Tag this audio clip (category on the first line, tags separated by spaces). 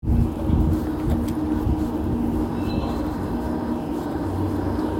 Sound effects > Vehicles
city Tampere traffic tram